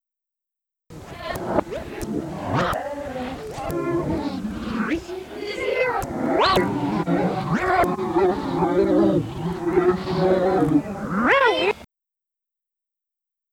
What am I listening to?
Sound effects > Other
SFX Damaged VHS
Short burst of analog VHS damage noise, ideal for horror edits.
analog, unsettling, creepy, horror, VCR, VHS, damaged, haunted